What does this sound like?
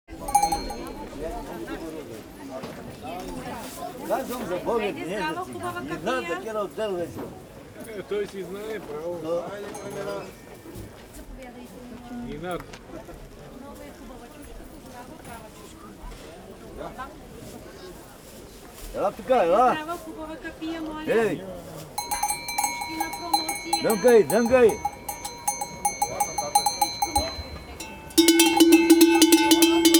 Soundscapes > Urban
bells's seller sohia market
A man is selling bells at Sophia open air market. Voices, men and women voices, different little bells.